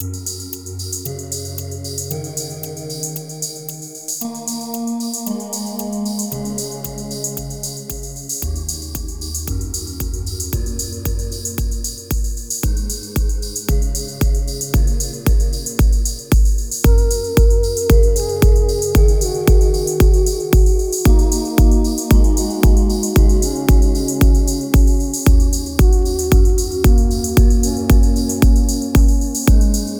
Music > Multiple instruments
A chill positive mellow yet hard beat loop with bass synth and lo-fi muted leads, reminiscent of afx or some ethereal idm created in FL Studio using various vsts. Thought up while roaming through the ancient Redwoods on the coast of California.